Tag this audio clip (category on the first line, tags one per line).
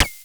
Sound effects > Electronic / Design
notification
UI
options
interface
digital
alert
menu
button